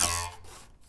Sound effects > Other mechanisms, engines, machines
metal shop foley -142
bam, bang, boom, bop, crackle, foley, fx, knock, little, metal, oneshot, perc, percussion, pop, rustle, sfx, shop, sound, strike, thud, tink, tools, wood